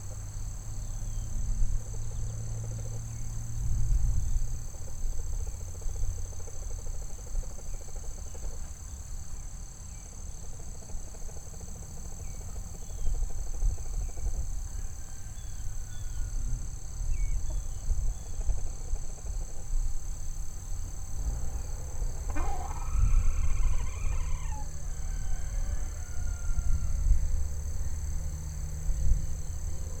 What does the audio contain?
Soundscapes > Nature
Sounds recorded on a farm, using a Zoom H5. Chickens, bugs, light wind, trucks out in the distance. The ambiance of a warm late summer day.